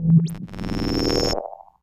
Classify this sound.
Sound effects > Experimental